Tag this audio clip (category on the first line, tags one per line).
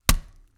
Sound effects > Experimental
bones
foley
onion
punch
thud
vegetable